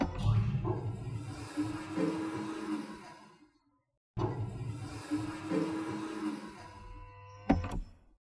Sound effects > Other mechanisms, engines, machines
Smooth Scifi Sliding Door Open and Close
A person-sized automatic door sound with a balance between heaviness and smoothness. The first sound is opening and the second sound is closing, a locking mechanism is heard every time the door is in a closed state. Great for video games.
door, electronic, motor, sci-fi, SciFi, sfx, soundeffects